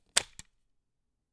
Sound effects > Objects / House appliances
Airsoft MP5 Mag removal - Rode NT5 Omni Split mono
Reminder to never point anything gun like (toy or not) at people. If you know it's empty, if you know it's safe, please still practice good gun handling and respect. Subject : A electric airsoft MP5. Date YMD : 2026 January 23 Location : France Indoors. Rode NT5 omni (Near the trigger/motor Left) NT5 Cardioid (Overhead). Weather : Processing : Trimmed and normalised in Audacity. Notes : Tips : There were 4 mics (NT5 Overhead, NT5 Motor/trigger, DJi MIC 3 trigger/motor, Dji Mic 3 barrel exit). No stereo pair really, but two recordings are grouped as a mono pair for safe-keeping and timing/sync. I suggest you mess with splitting /mixing them to mono recordings. If you know it's empty, if you know it's safe, please still practice good gun handling and respect.